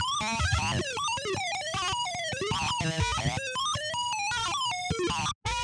Sound effects > Electronic / Design
Evil robot 3
Evil robot sound designed for a sci-fi videogame.
enemy, evil, fantasy, robot, sci-fi, sfx, sounddesign, videogame